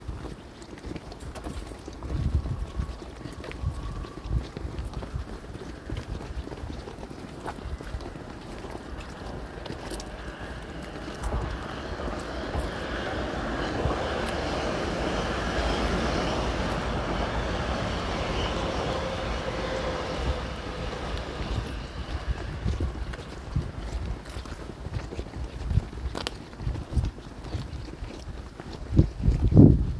Soundscapes > Urban
Subway train passing under bridge
The recording is taken while walking with a large group of people over the top of a bridge outside that’s crossing over a subway track, while the subway train passes under. The microphone used is the one attached to the Samsung Galaxy 23. Almost the entire clip is dominated by the shuffling of walking footsteps. It was dry that day, yet the footsteps have this wet and sort of squishy sound. Likely due to the amount of people walking without talking. You can hear the vibrations in the metal tracks that the train is inbound, and these vibrations can be heard faintly after it has passed. The middle of the clip is taken over by the train passing by and pushing air aside in its path.